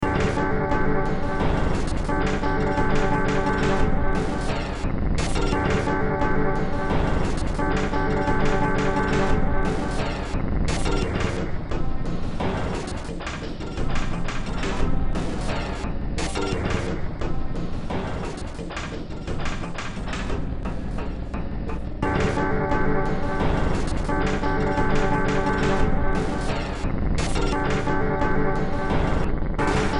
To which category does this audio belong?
Music > Multiple instruments